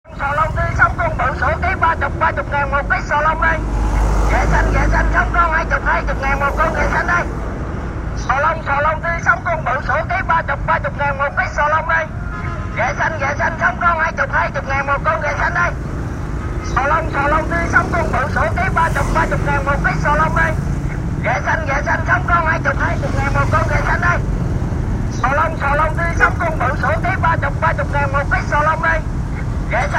Speech > Solo speech
Man sell clam and crab say 'Sò lông, sò lông, ký bự 30 ngàn một ký, sò lông đây. Ghẹ xanh, ghẹ xanh, sống, con 20 ngàn, 20 ngàn một con, ghẹ xanh đây'. 2025.12.16 16:54